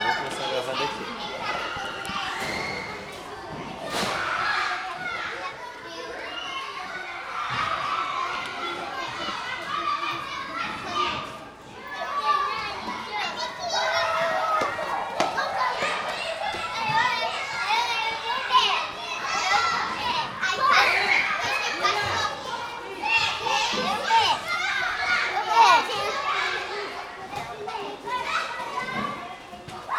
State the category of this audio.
Soundscapes > Indoors